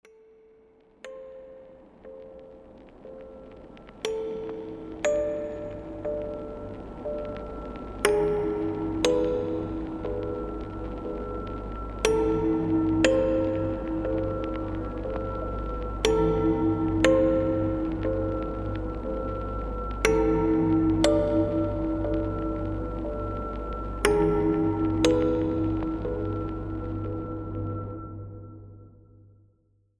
Music > Multiple instruments
Haunting Chernobyl Atmosphere
Dark ambient track with haunting music box and Geiger counter hiss, perfect for dark films, horror games, and mysterious scenes.
ambient, atmospheric, box, chernobyl, cinematic, dark, eerie, haunting, horror, music, mysterious, radiation, soundscape, tension